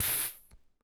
Speech > Solo speech
Annoyed - Pfft 4
annoyed, dialogue, FR-AV2, grumpy, Human, Male, Man, Mid-20s, Neumann, NPC, oneshot, singletake, Single-take, talk, Tascam, U67, upset, Video-game, Vocal, voice, Voice-acting